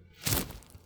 Sound effects > Human sounds and actions
A simple recording of me falling to my knees in the sand with some grass.